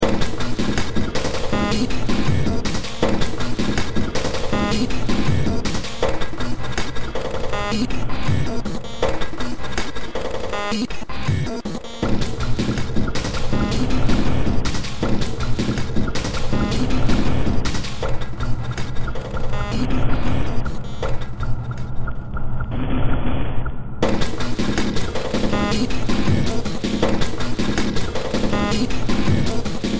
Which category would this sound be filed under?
Music > Multiple instruments